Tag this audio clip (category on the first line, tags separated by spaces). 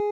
Instrument samples > String
arpeggio tone stratocaster guitar sound cheap design